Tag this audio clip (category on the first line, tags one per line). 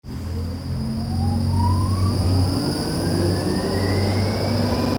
Soundscapes > Urban
streetcar
tram
transport